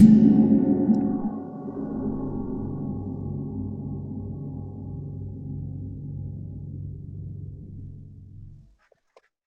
Music > Solo instrument
Gong Cymbal-002
Crash, Custom, Cymbal, Cymbals, Drum, Drums, FX, GONG, Hat, Kit, Metal, Oneshot, Paiste, Perc, Percussion, Ride, Sabian